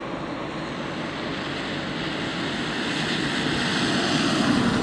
Soundscapes > Urban
Car passing by, recorded with a mobilephone Samsung Galaxy S25, recorded in windy and rainy evening in Tampere suburban area. Wet asphalt with a little gravel on top and car had wintertyres
tyres, car, city, driving